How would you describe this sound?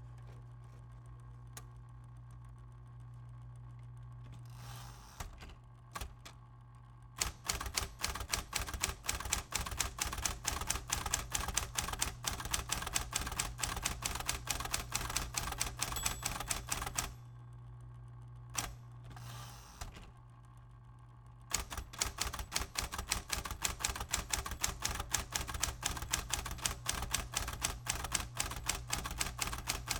Sound effects > Other mechanisms, engines, machines
COMType Sears Electric Twelve, Repetitive Patterns ShaneVincent MKH416 20250519
Repetitive 3-stroke patterns on a Sears Electric Twelve typewriter. Microphone: Sennheiser MKH-416 Microphone Configuration: Mono Recording Device: Zoom H8 Handy Recorder